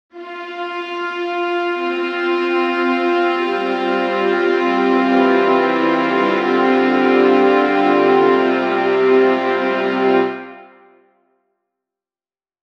Music > Other
This is the End (Loud)
It's a short loop of a synth string. Maybe useful for larger music piece. Made with Garage Studio. I'd be happy if you tagged me but it isn't mandatory.
electronic, music